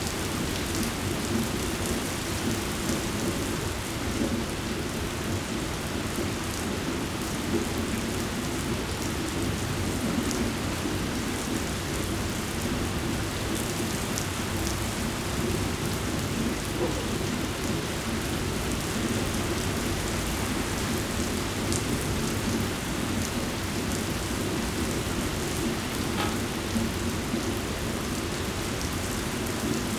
Soundscapes > Urban
Rio de Janeiro - Rain and thunder
Field recording on a rooftop in Botafogo, Rio de Janeiro. Date: 2025/12/02 Time: 4 pm Recorder: Rode Wireless Pro w. internal microphones